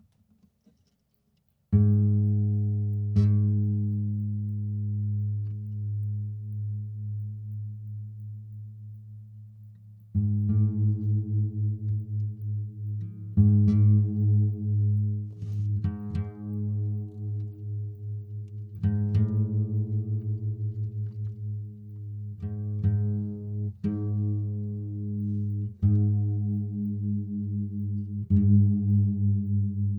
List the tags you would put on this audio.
Soundscapes > Synthetic / Artificial
atmosphere ambient space background-sound soundscape general-noise

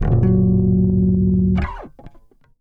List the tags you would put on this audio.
Music > Solo instrument
note pluck

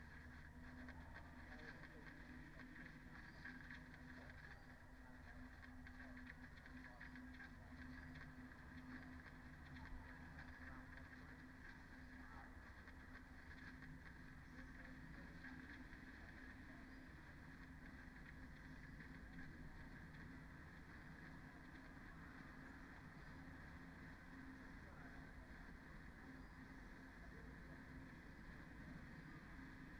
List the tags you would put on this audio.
Soundscapes > Nature
Dendrophone phenological-recording field-recording weather-data raspberry-pi alice-holt-forest sound-installation artistic-intervention modified-soundscape data-to-sound soundscape natural-soundscape nature

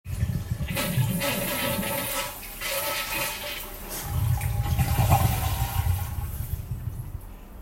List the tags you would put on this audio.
Sound effects > Objects / House appliances
flush flushing toilet

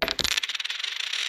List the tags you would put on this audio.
Sound effects > Objects / House appliances
Phone-recording spin drop foley penny

